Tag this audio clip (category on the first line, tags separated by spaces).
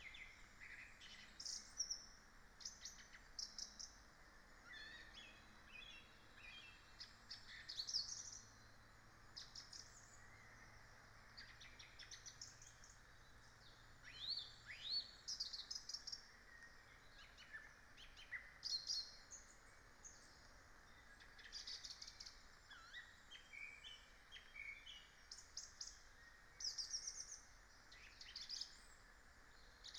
Soundscapes > Nature
weather-data nature sound-installation data-to-sound artistic-intervention phenological-recording